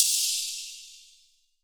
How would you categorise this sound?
Instrument samples > Percussion